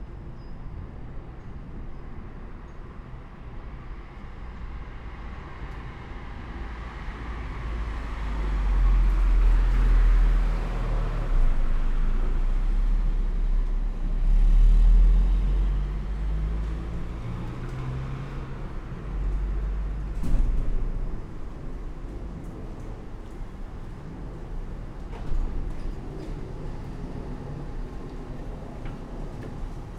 Soundscapes > Urban
OM-08 vs EM272 outdoor - OM-08 version
Outdoor mic comparison : Rycote OM-08 vs Clippy EM272 – EM272 version. For my friend Nico and all of you who might be interested, here is a microphone comparison between Rycote OM-08 and Micbooster Clippy EM272. This is the EM272 version, recorded from my balcony. One can hear a generic suburban atmosphere, with some cars passing by in the street, some people talking (kid and adults), and noises from the surroundings. Mics were placed about 36cm apart. Recorded with zoom H5Studio.
balcony, comparison, OM-08, outdoor, Rycote, street